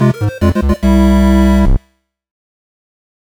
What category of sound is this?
Music > Multiple instruments